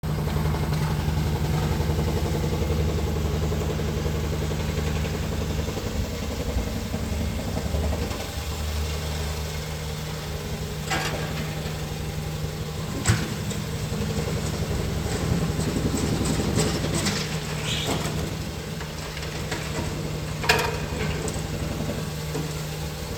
Soundscapes > Urban
recorded from an open window near a construction site in Germany